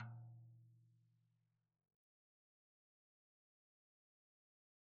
Music > Solo percussion
Med-low Tom - Oneshot 40 12 inch Sonor Force 3007 Maple Rack
beat, tomdrum, roll, Tom, drums, toms, drumkit, med-tom, maple, oneshot, Medium-Tom, acoustic, loop, recording, real, quality, drum, perc, flam, percussion, wood, realdrum, kit